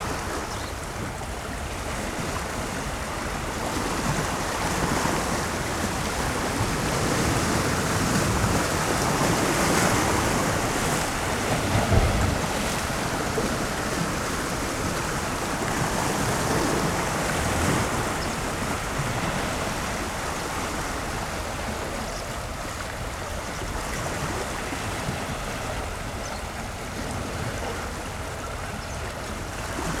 Soundscapes > Nature
Sea waves crashing rocks calm, distant seagull
rocks, sea, seashore, water, waves